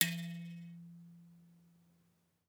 Sound effects > Other mechanisms, engines, machines
High Boing 04
Recorded in my Dad's garage with a Zoom H4N, 2017.
sample, noise